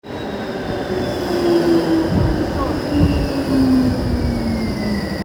Soundscapes > Urban
Sound of tram moving near a stop in Tampere. Recorded with Apple iPhone 15.
tram, transport, streetcar